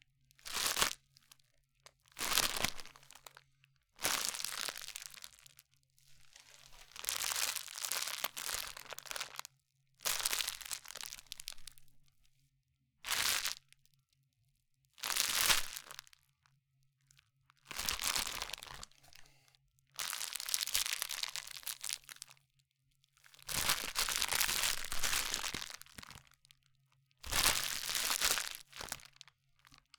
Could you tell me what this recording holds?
Sound effects > Objects / House appliances
Candy Bag Crinkle
The sounds of handling a thin plastic bag of candy. Useful in animation for accentuating grabbing, twisting, and gripping something.